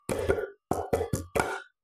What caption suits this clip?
Music > Solo percussion
Drum beats 3
Loopable drum beats Made with tapping an object like the side of an old drum
Drum, Bass, Abstract, Percussion, Loop, Hits, Rhythm, House, Kick